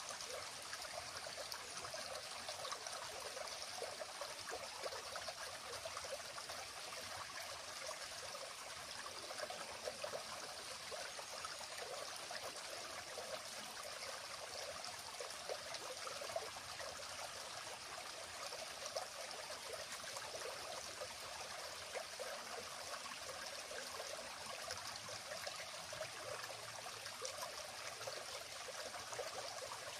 Soundscapes > Nature
Small Stream in a Forest